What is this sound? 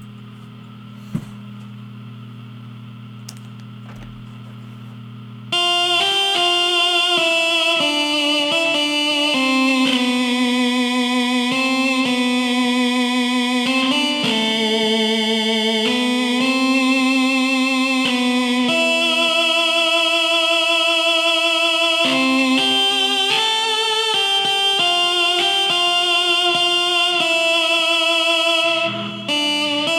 Soundscapes > Synthetic / Artificial
A freestyle symphony
synth, experimental